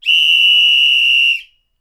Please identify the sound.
Sound effects > Other
Coach whistle
It's a kind of cheap and basic whistle I got a long while ago just so that I could record it. Recorded in Audacity on Windows 10 with a RODE NT1 connected through a Behringer U-Phoria UM2. I'm fairly certain I hadn't needed to do any post-processing.
whistle, sports